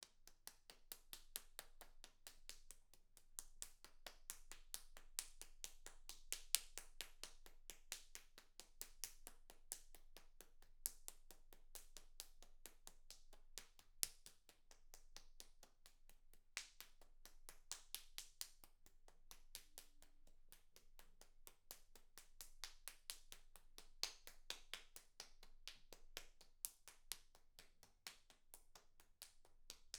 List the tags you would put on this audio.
Sound effects > Human sounds and actions
Applause
Applaud
NT5
solo
FR-AV2
Tascam

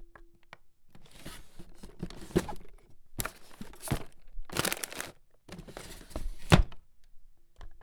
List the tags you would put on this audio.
Sound effects > Objects / House appliances
drawer
dresser

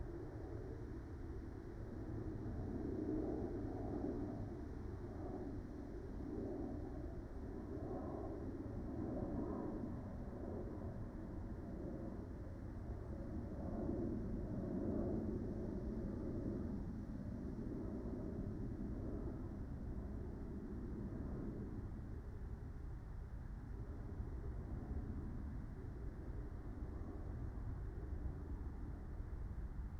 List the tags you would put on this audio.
Soundscapes > Nature
soundscape
natural-soundscape
raspberry-pi
meadow
nature
alice-holt-forest
phenological-recording
field-recording

